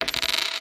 Sound effects > Objects / House appliances
OBJCoin-Samsung Galaxy Smartphone, CU Penny, Drop, Spin 02 Nicholas Judy TDC
A penny dropping and spinning.
spin, penny, drop, Phone-recording, foley